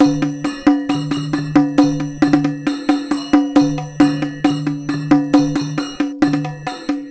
Music > Other
india summer (remix of 2 users) 135 bpm
beat, drumloop, drums, india, loop, tab, tambourin